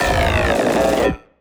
Sound effects > Experimental
destroyed glitchy impact fx -001
abstract, hiphop, percussion, laser, otherworldy, pop, edm, whizz, impacts, zap, lazer, idm, sfx, perc, crack, glitch, clap, glitchy, impact, experimental, snap, fx, alien